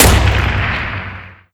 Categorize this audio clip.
Sound effects > Other mechanisms, engines, machines